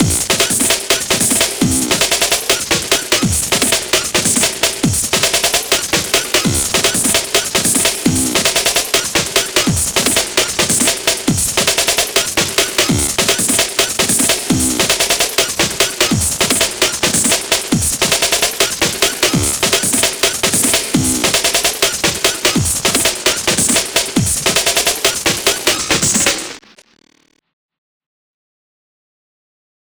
Music > Other
breaks breaks breaks 149 bpm